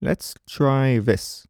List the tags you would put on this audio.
Speech > Solo speech
2025 Adult Calm FR-AV2 Generic-lines Hypercardioid july lets-try-this Male mid-20s MKE-600 MKE600 Sennheiser Shotgun-mic Shotgun-microphone Single-mic-mono Tascam thinking VA Voice-acting

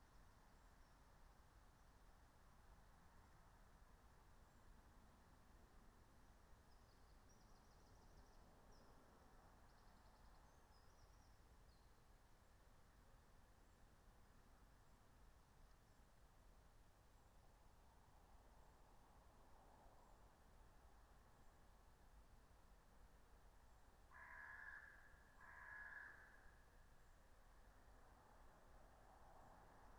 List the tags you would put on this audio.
Soundscapes > Nature
meadow soundscape nature raspberry-pi field-recording natural-soundscape phenological-recording alice-holt-forest

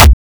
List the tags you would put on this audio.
Instrument samples > Percussion
Distorted BrazilFunk Kick

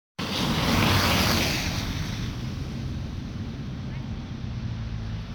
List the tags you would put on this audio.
Soundscapes > Urban
Car passing studded tires

